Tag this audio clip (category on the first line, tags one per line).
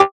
Instrument samples > Synths / Electronic
bass
fm-synthesis